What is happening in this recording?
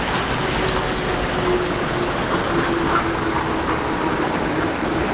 Sound effects > Vehicles
tram distant decelerating
Tram decelerating at a small distance with a nearby slow-moving car with winter tyres passing by. Recorded on a parking lot-tram crossing with the default device microphone of a Samsung Galaxy S20+. TRAM: ForCity Smart Artic X34
deceleration, transport